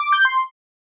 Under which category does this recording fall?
Sound effects > Electronic / Design